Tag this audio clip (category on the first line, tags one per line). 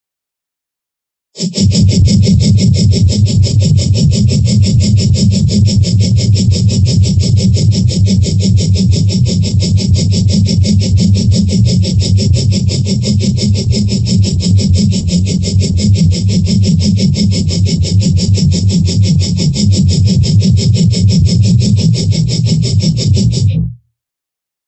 Music > Solo percussion
Experimental
Experimental-Production
Four-Over-Four-Pattern
Silly
Simple-Drum-Pattern